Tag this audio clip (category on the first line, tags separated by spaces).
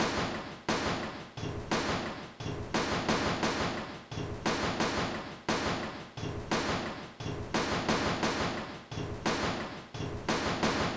Instrument samples > Percussion
Alien Dark Industrial Packs Samples Soundtrack Weird